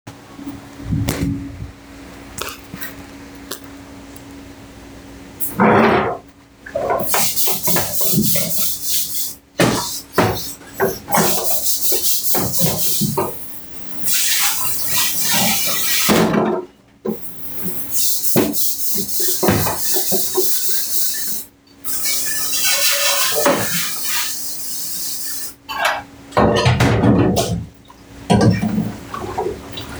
Soundscapes > Indoors
Brief Recording of me working the 3-sink compartment of a dip-shit in the kitchen of a fine dining establishment somewhere in Tulsa, OK.